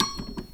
Sound effects > Other mechanisms, engines, machines
metal shop foley -196
knock, thud, fx, pop, sfx, oneshot, percussion, rustle, tink